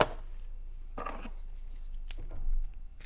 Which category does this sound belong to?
Sound effects > Other mechanisms, engines, machines